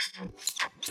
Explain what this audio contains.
Sound effects > Experimental
Glitch Percs 20 flttr fx
lazer, pop, glitch, zap, abstract, whizz, experimental, impact, fx, idm, snap, crack, perc, clap, glitchy, otherworldy, hiphop, percussion, laser, sfx, edm, alien, impacts